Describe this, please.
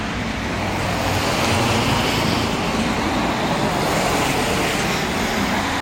Sound effects > Vehicles
Cars accelerating 24
car, drive, engine, hervanta, road, tampere